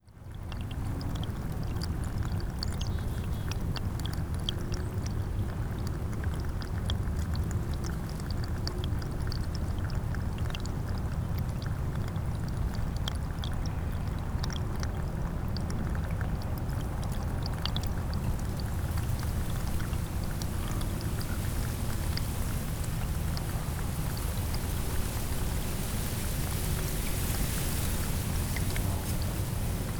Soundscapes > Nature
flood stream 1 - black river - 01.16.26
A tiny stream formed from the river flowing past its bank sings with birds and wind.
river, stream, water, wind